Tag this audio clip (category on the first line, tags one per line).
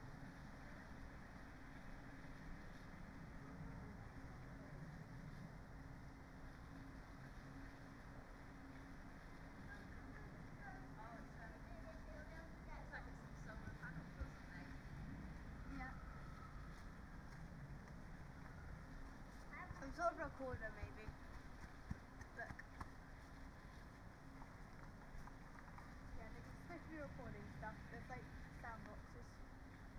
Soundscapes > Nature
alice-holt-forest
data-to-sound
Dendrophone
soundscape